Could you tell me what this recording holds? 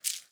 Sound effects > Natural elements and explosions
Bush sound effect
Hitting a dry bush with a stick recorded with a Rode NTG-3. Could be used as a stick break or a footstep on foliage etc.